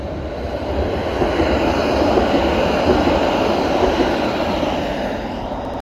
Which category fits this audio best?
Soundscapes > Urban